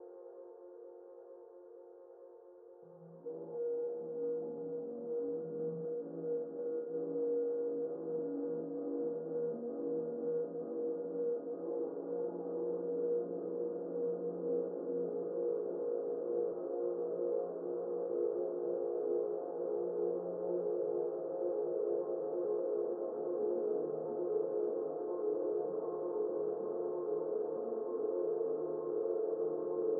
Music > Multiple instruments
Behind The Mirror (Ambient)
quiet atmospheric sound recorded with Fl Studio 10
ambiance, ambience, ambient, atmo, atmosphere, drone, experimental, reverb, soundscape